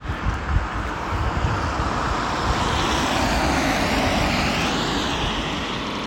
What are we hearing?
Sound effects > Vehicles
Car driving 7
car, hervanta, tampere